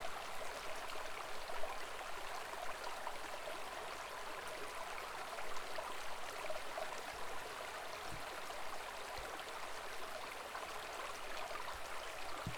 Soundscapes > Nature
Bachlauf Sound 3
Ein Bachlauf in Mecklemburgvorpommern Deutschland. Aufgenommen mit Tascam. A stream in Mecklenburg-Western Pomerania, Germany. Recorded with Tascam.
river, water, flowing, stream, flow